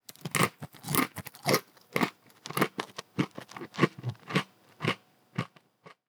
Sound effects > Other
FOODEat Cinematis RandomFoleyVol2 CrunchyBites PeanutsBite ClosedMouth NormalChew Freebie
bite, bites, design, effects, foley, food, handling, peanuts, plastic, postproduction, recording, rustle, SFX, snack, sound